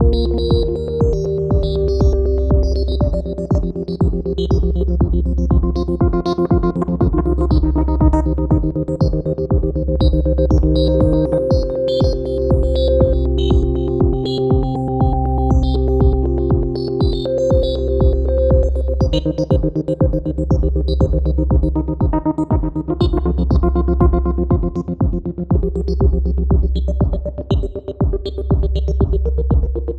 Music > Multiple instruments
Drum Loop with Guitar Grains at 120bpm #001
A drum loop with granular synthesis on a guitar sample. The target was to explore granular synthesis on Digitakt 2 :) Guitar sample recorded from my own guitar. The drum samples are from the factory sounds of Digitakt 2.